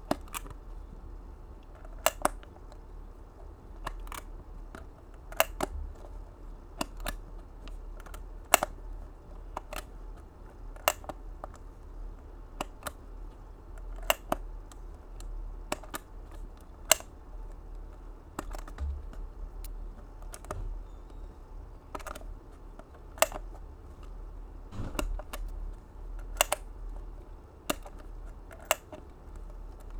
Sound effects > Objects / House appliances
MECHLtch-Blue Snowball Microphone, MCU Jewerly Box, Unlatch, Latch Nicholas Judy TDC
A jewelry box unlatching and latching.
Blue-brand, Blue-Snowball, foley, jewelry-box, latch, unlatch